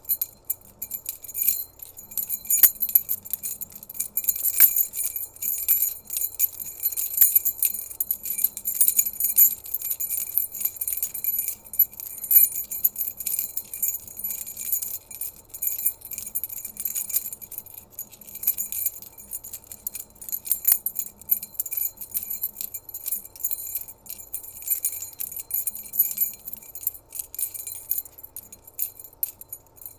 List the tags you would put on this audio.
Sound effects > Objects / House appliances
Blue-brand
jingle

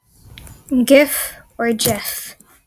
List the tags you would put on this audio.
Speech > Solo speech
english
gif
jif

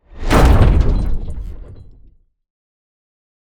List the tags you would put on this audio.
Sound effects > Other
audio
bang
blunt
cinematic
collision
crash
design
effects
explosion
force
game
hard
heavy
hit
impact
percussive
power
rumble
sfx
sharp
shockwave
smash
sound
strike
thud
transient

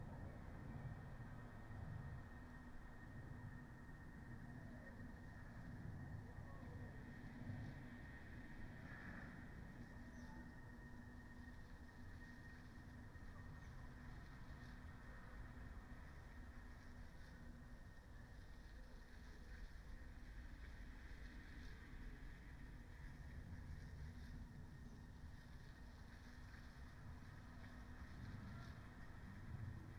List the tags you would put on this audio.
Soundscapes > Nature
soundscape
alice-holt-forest
phenological-recording
Dendrophone
artistic-intervention
modified-soundscape
weather-data
field-recording
natural-soundscape
nature
data-to-sound
sound-installation
raspberry-pi

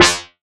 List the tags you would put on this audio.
Instrument samples > Synths / Electronic
additive-synthesis
fm-synthesis
bass

